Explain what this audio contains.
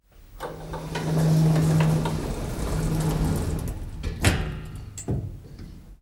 Sound effects > Other mechanisms, engines, machines
Sound of elevator doors closing. Not sure what manufacturer of elevator it was. Recorded via Zoom H1n.